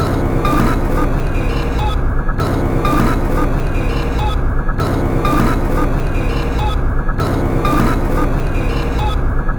Instrument samples > Percussion
This 200bpm Drum Loop is good for composing Industrial/Electronic/Ambient songs or using as soundtrack to a sci-fi/suspense/horror indie game or short film.
Underground, Drum, Samples, Industrial, Loopable, Packs, Weird, Soundtrack, Dark, Ambient, Loop, Alien